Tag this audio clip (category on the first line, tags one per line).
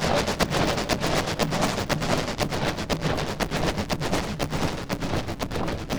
Sound effects > Electronic / Design
commons
creative
free
industrial
industrial-noise
industrial-techno
noise
rhythm
royalty
sci-fi
scifi
sound-design